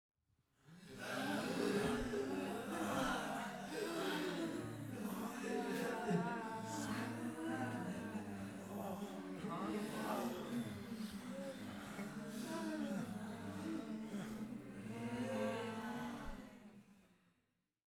Speech > Conversation / Crowd
Group Of People Returning From A Trance State
Medium-small group of people (males and females) acting as if just and slowly returning from a trance state, confusing and barely recovering conscience. Gear: Zoom H4n XY Built-In Capsules Processed to reduce the width
crowd, female, moan, sect, wake, zombie